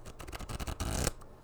Sound effects > Objects / House appliances
GAMEMisc-Blue Snowball Microphone Cards, Shuffle 06 Nicholas Judy TDC
Cards being shuffled.
cards, Blue-brand, foley, shuffle, Blue-Snowball